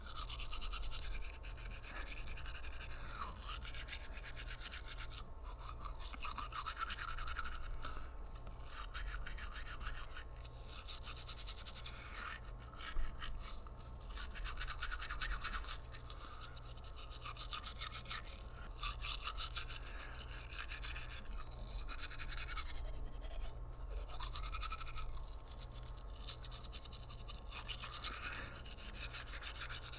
Sound effects > Objects / House appliances
HMNMisc-Blue Snowball Microphone Manual Toothbrush, Brushing Teeth Nicholas Judy TDC
A manual toothbrush brushing someone's teeth.